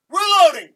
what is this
Speech > Solo speech
Soldier saying Reloading